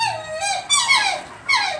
Sound effects > Animals
Waterfowl - Black Swans, Take 2
Recorded with an LG Stylus 2 at Hope Ranch Zoo, these are the calls of Australia's black swans, which are known for being used as Dilophosaurus vocals in the Jurassic Park franchise.
bird, australia, black, honk, swan, waterfowl